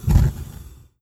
Sound effects > Natural elements and explosions
A fire poof.